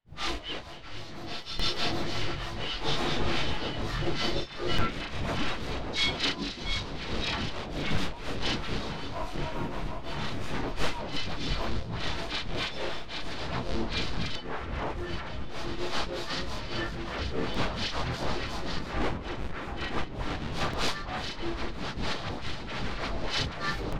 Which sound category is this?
Sound effects > Electronic / Design